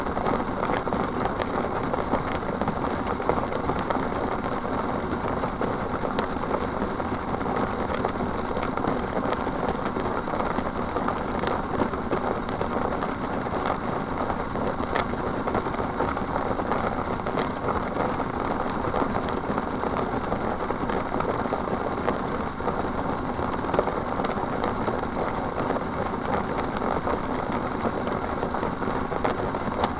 Sound effects > Other mechanisms, engines, machines
Rock Tumbler
Tumbler with small stones. Recorded with a TASCAM DR-05X.
Crush, Grind, Stone